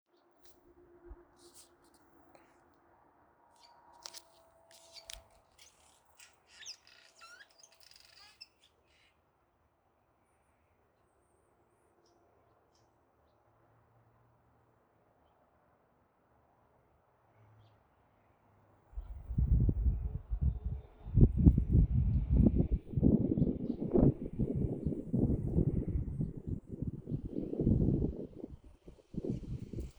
Soundscapes > Nature
California birds recorded at my art studio warehouse space in the Redwoods, birds in a nest as i sit below and gaze at the sky. Recorded with my Tascam dr-05 field recorder, I wll upgrade recorders soon i promise, for now its not too bad!